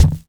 Instrument samples > Other
Trimed, some fades, and distortion on the sponge sound, and speeded-up 2x the second half post transient on the fingernail on matress sound.